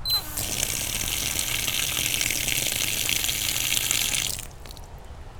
Sound effects > Objects / House appliances
Hose being turned on with hum from the pressure.